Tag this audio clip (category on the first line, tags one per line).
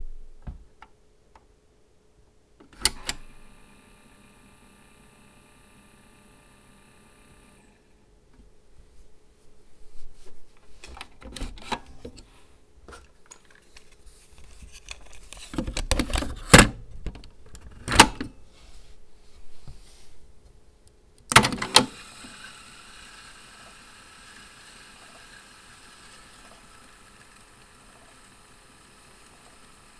Sound effects > Objects / House appliances
fast
deck
rewind
play
noises
cassette
mechanical
forward